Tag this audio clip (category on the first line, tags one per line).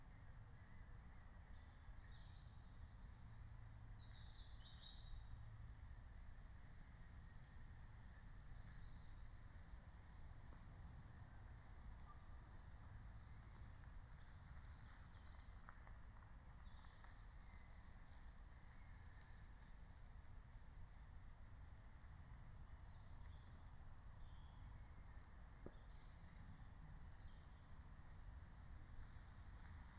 Soundscapes > Nature
alice-holt-forest
meadow
natural-soundscape
nature
phenological-recording
raspberry-pi